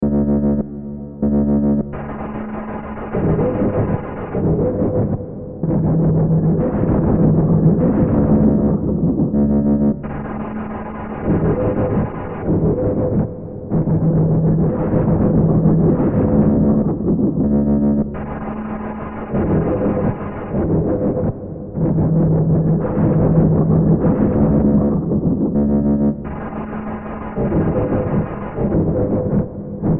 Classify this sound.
Soundscapes > Synthetic / Artificial